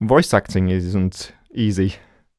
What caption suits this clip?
Speech > Solo speech
Other - Voice acting isn't easy
dialogue, FR-AV2, Human, Male, Man, Mid-20s, Neumann, NPC, oneshot, Sentence, singletake, Single-take, talk, Tascam, U67, Video-game, Vocal, Voice-acting